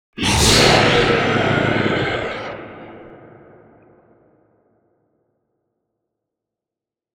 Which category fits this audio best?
Sound effects > Animals